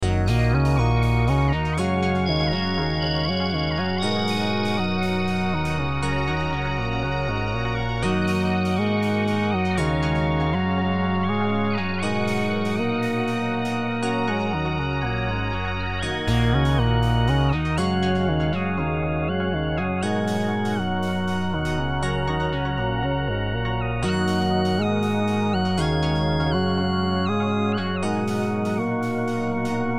Music > Multiple instruments
Melodic Bells with Synths made into an Idea while playing live midi
Made in FL11, not sure, old idea i made while trying stuff out like the rest of these.